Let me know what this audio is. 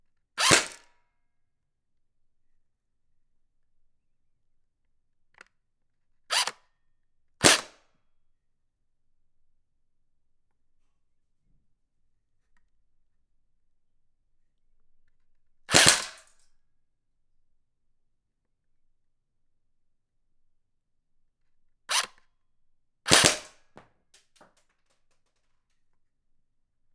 Sound effects > Objects / House appliances
Airsoft Shooting a can (gun pov) - NT5 Split mono
Reminder to never point anything gun like (toy or not) at people. If you know it's empty, if you know it's safe, please still practice good gun handling and respect. Subject : A electric airsoft MP5. Date YMD : 2026 January 23 Location : France Indoors. Rode NT5 omni (Near the trigger/motor Left) NT5 Cardioid (Overhead). Weather : Processing : Trimmed and normalised in Audacity. Notes : Tips : There were 4 mics (NT5 Overhead, NT5 Motor/trigger, DJi MIC 3 trigger/motor, Dji Mic 3 barrel exit). No stereo pair really, but two recordings are grouped as a mono pair for safe-keeping and timing/sync. I suggest you mess with splitting /mixing them to mono recordings. If you know it's empty, if you know it's safe, please still practice good gun handling and respect.
Air-soft, Mono, mag, Tascam, shooting, sodacan, soda-can, FR-AV2, Airsoft, can, MP5, NT5